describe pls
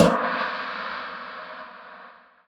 Instrument samples > Percussion
cheapgong fake 1b shorter
A crashgong to be used in metal/rock/jazz music. Shortened version of the namesake soundfile. tags: crashgong gongcrash ride crash China sinocymbal cymbal drum drums crash-gong gong gong-crash brass bronze cymbals Istanbul low-pitched Meinl metal metallic Sabian sinocymbal Sinocymbal smash Soultone Stagg synthetic unnatural Zildjian Zultan
bronze, crash, crash-gong, crashgong, cymbal, cymbals, drum, drums, gong, gong-crash, gongcrash, Istanbul, low-pitched, Meinl, metal, metallic, ride, Sabian, sinocymbal, smash, Soultone, Stagg, synthetic, unnatural